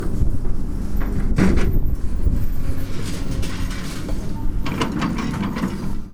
Sound effects > Objects / House appliances
Junkyard Foley and FX Percs (Metal, Clanks, Scrapes, Bangs, Scrap, and Machines) 130
Ambience, Atmosphere, Bang, Clang, Clank, dumping, dumpster, Environment, Foley, FX, Metal, Metallic, Perc, Percussion, rattle, rubbish, SFX, Smash, trash, waste